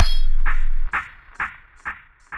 Sound effects > Electronic / Design
Impact Percs with Bass and fx-023
bash, bass, brooding, cinamatic, combination, crunch, deep, explode, explosion, foreboding, fx, hit, impact, looming, low, mulit, ominous, oneshot, perc, percussion, sfx, smash, theatrical